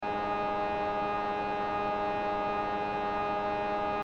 Sound effects > Other mechanisms, engines, machines
BPSN-5U2 head end power unit sound (+cabin vent low)
The distinctive hum heard from stopped 81-717 subway trains on the Moscow Metro (and other Soviet subway systems). It is produced from the BPSN-5U2 "auxilliary power supply unit", which is a static inverter that converts 750V DC from the third rail into 80V DC for the control systems and 230 V AC 50Hz for the interior lights. The hum is produced by the secondary converter inside the BPSN block, which produces alternating current (the primary converter is just a DC transformer and doesn't produce much noise). The sound of the cabin ventilator on low power can be heard. Not a recording.